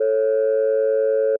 Instrument samples > Synths / Electronic
Landline Phonelike Synth A5
Holding-Tone, JI, JI-3rd, JI-Third, just-minor-3rd, just-minor-third, Landline, Landline-Holding-Tone, Landline-Phone, Landline-Phonelike-Synth, Landline-Telephone, Landline-Telephone-like-Sound, Old-School-Telephone, Synth, Tone-Plus-386c